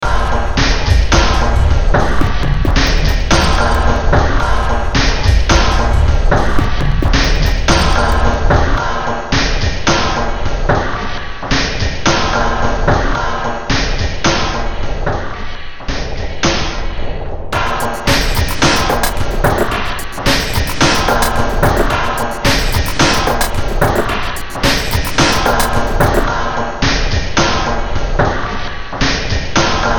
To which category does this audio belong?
Music > Multiple instruments